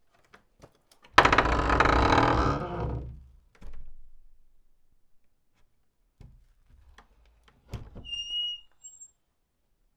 Sound effects > Objects / House appliances
Old cave door 1m XY
Subject : An old barn door with big metal hinges and old wood. Closing then opening. Recorded 1m away. Date YMD : 2025 04 Location : Gergueil France Hardware : Tascam FR-AV2 and a Rode NT5 microphone in a XY setup. Weather : Processing : Trimmed and Normalized in Audacity. Maybe with a fade in and out? Should be in the metadata if there is.
XY, Door, hinge, Tascam, NT5, indoor, closing, 1m-distance, opening, FR-AV2, 1m-away, Rode, Dare2025-06A